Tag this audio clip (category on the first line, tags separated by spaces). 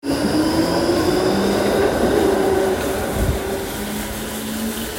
Sound effects > Vehicles
city tram field-recording traffic Tampere